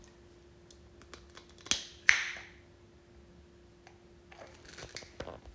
Sound effects > Human sounds and actions
FOLYHand snap finger MPA FCS2
snap, chasquido